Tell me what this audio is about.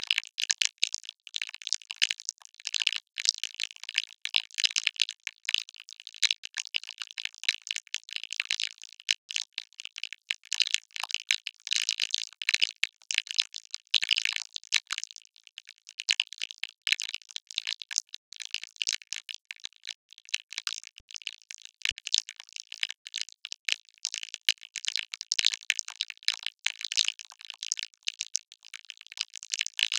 Sound effects > Electronic / Design
ROS-Mushroom Eater Texture1-Hi Passed
I was dragged a drumloop into phaseplant granular. Processed with KHS Filter Table, Vocodex, ZL EQ and Fruity Limiter. Sample used from: TOUCH-LOOPS-VINTAGE-DRUM-KIT-BANDLAB